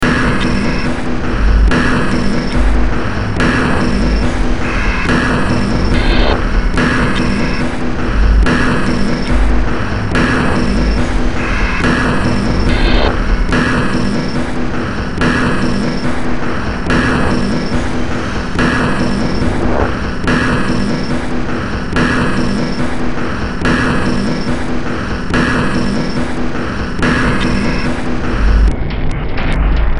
Music > Multiple instruments
Demo Track #3618 (Industraumatic)
Noise, Cyberpunk, Underground, Industrial, Ambient, Sci-fi, Horror, Soundtrack, Games